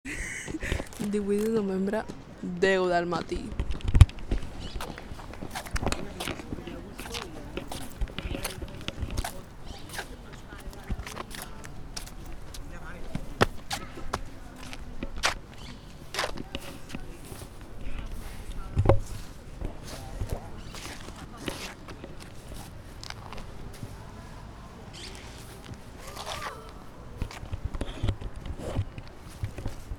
Soundscapes > Urban
20251118 Lanzarote MiaRosalia
Ambience, SoundMap, Urban